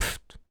Speech > Solo speech
Annoyed - Pfft 1
Single-take, voice, Tascam, Neumann, annoyed, upset, U67, grumpy, oneshot, dialogue, Vocal